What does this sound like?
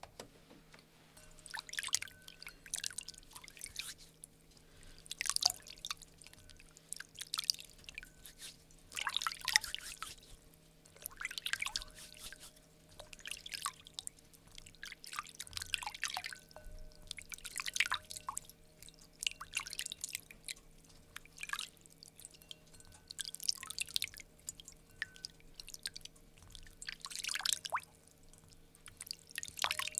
Sound effects > Human sounds and actions
Hands through water in ceramic bowl.
Moving my hands through water in a ceramic wash basin, no running water. Hands rub together, flicking fingers at the end of the clip. You can hear the ringing of fingernails against the ceramic bowl. Recorded in studio.
basin, bowl, bucket, ceramic, clean, dip, drip, fashioned, fingers, flick, fluid, hand, hands, manipulate, old, rub, slosh, wash, water